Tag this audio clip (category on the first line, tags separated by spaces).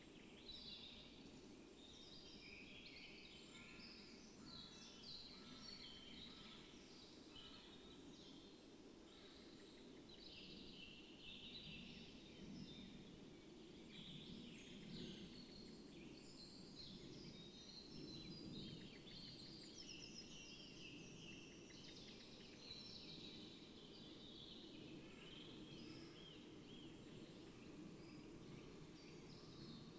Soundscapes > Nature
Dendrophone artistic-intervention natural-soundscape data-to-sound weather-data raspberry-pi nature field-recording phenological-recording alice-holt-forest soundscape sound-installation modified-soundscape